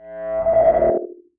Sound effects > Experimental
Analog Bass, Sweeps, and FX-194
retro, bass, sample, weird, analog, oneshot, machine, snythesizer, korg, sci-fi